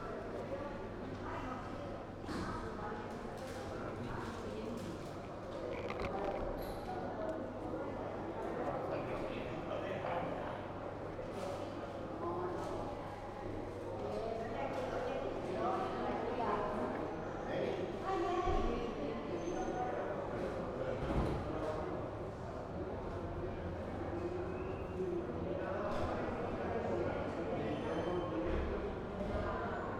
Speech > Other
Walla captured from the stairs next to the lobby. Sounds from doors, hits, elevator doors... ·················· Ambiente interior difuso de voces que llegan a la recepción del hotel. Mayoritariamente usuarios del Imserso. Grabado detrás de las escaleras de la izquierda.